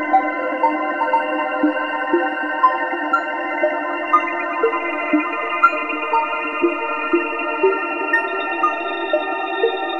Sound effects > Electronic / Design
Shimmering Ethernal Reverb Loop at 120 bpm
A shimmering and brilliant reverb loop at 120 bpm Done with Digitakt 2, a water drop sample recorded with Tascam Portacapture X6, and Rymdigare